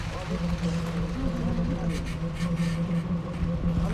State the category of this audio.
Sound effects > Vehicles